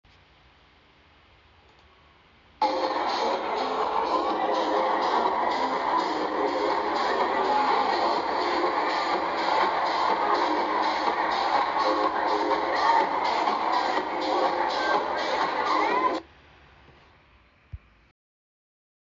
Sound effects > Human sounds and actions
Event gathering
people networking at event.
event, gathering, community